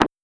Sound effects > Human sounds and actions
LoFiFootsteps Stone Walking-07
Shoes on stone and rocks, walking. Lo-fi. Foley emulation using wavetable synthesis.